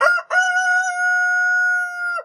Sound effects > Animals
BIRDFowl Rooster Crow, Boisterous Cock
captured this magnificent rooster crow at the river street ranch petting zoo in san juan capistrano, ca. recorded on iphone, cleaned up in ableton with MSpectralDynamics. first upload of 2026!
alarm, barn, chicken, crowing, farm, morning, rooster